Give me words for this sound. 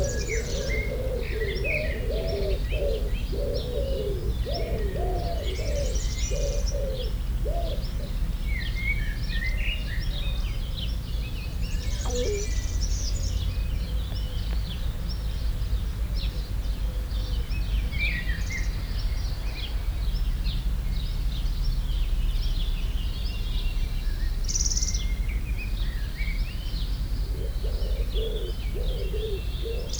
Soundscapes > Urban
20250609-09h42 Albi Cimetière de la Madeleine OKM1
Subject : A binaural recording of Madeleine's cemetery. Facing east (not even perpendicular to the path, just est) Date YMD : 2025 06 09 (Monday) 09h42 Location : Pratgraussal Albi 81000 Tarn Occitanie France. Outdoors Hardware : Tascam FR-AV2, Soundman OKM1 Binaural in ear microphones. Weather : Clear sky 24°c ish, little to no wind. Processing : Trimmed in Audacity. Probably a 40hz 12db per octave HPF applied. (Check metadata) Notes : That day, there was a triathlon going on.
Tarn, OKM-1, Occitanie, monday, In-ear-microphones, City